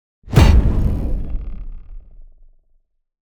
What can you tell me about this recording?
Sound effects > Other
Sound Design Elements Impact SFX PS 059
cinematic, design, explosion, heavy, hit, power, sfx, sharp, smash, sound, thudbang